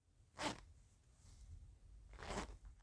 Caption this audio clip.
Sound effects > Objects / House appliances
Opening & closing a zipper on a pair of jeans
Opening and closing the zipper on a pair of jeans. Made by R&B Sound Bites if you ever feel like crediting me ever for any of my sounds you use. Good to use for Indie game making or movie making. This will help me know what you like and what to work on. Get Creative!